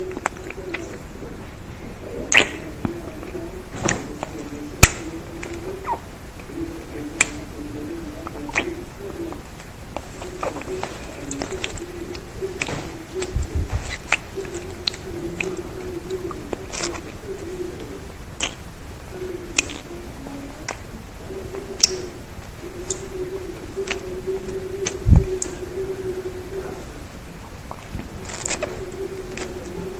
Sound effects > Human sounds and actions
masturbation amateur
I SUCK MY BIG TITS AND MASTURBATE MY WET PUSSY there is only sound but very exciting for you I'm really excited that you're listening to me masturbate on the phone in secret. I AM A VIRGIN Big Tits,Masturbate,masturbated Masturbation,PussyShaved,Pussy,Sucking,Solo,Real Orgasm,amateur